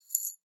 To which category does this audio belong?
Sound effects > Objects / House appliances